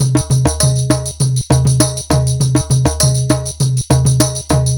Music > Other
FL studio 9 pattern construction with drums kits loop ethnique